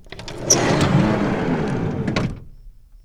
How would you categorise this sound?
Sound effects > Vehicles